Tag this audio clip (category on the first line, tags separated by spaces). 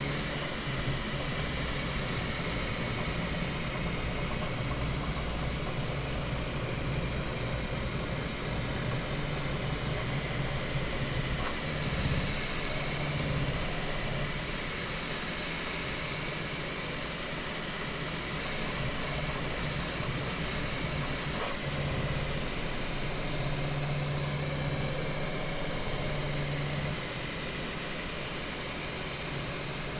Sound effects > Other mechanisms, engines, machines
3D-Printer Machine Manufacturing